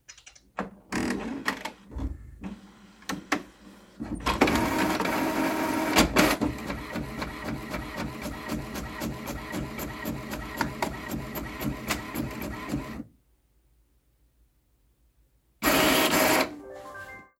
Sound effects > Objects / House appliances
MACHOffc-Samsung Galaxy Smartphone, CU HP OfficeJet 4650-Printer Printing Nicholas Judy TDC
An HP OfficeJet 4650 printer printing.
hp, officejet, Phone-recording, print, printer